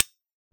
Sound effects > Other mechanisms, engines, machines
Circuit breaker switch-003
It's a circuit breaker's switch, as simple as that. Follow my social media please, I'm begging..